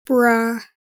Speech > Solo speech
Rebellious Female Teen Says Bruh
A rebellious female teen around 13-15 says "Bruh." in a tired way or expressing frustration.
bruh, rebellious, teen